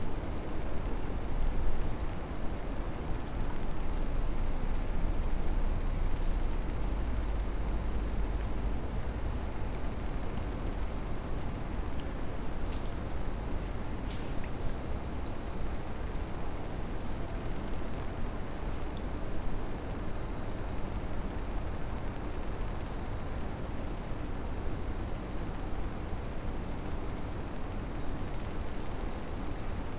Soundscapes > Nature
250811 04h42 Albi Pratgraussals SE Lake - NT5o
2025, 81000, Albi, August, City, Early-morning, France, FR-AV2, Lake, Mono, night, NT5-o, NT5o, Occitanie, Omni, Park, Pratgraussals, Rode, Single-mic-mono, Tarn, Tascam